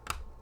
Sound effects > Objects / House appliances
OBJWrite-Blue Snowball Microphone Pencil, Set Down on Desk Nicholas Judy TDC
A pencil setting down on a desk.
Blue-brand, Blue-Snowball, desk, down, foley, pencil, set